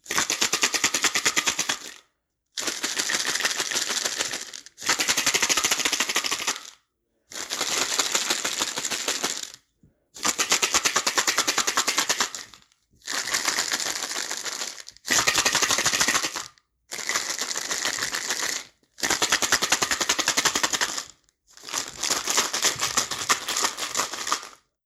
Sound effects > Objects / House appliances
TOONShake-Samsung Galaxy Smartphone, CU Pill Bottle 01 Nicholas Judy TDC
A pill bottle shaking.
cartoon, shake, bottle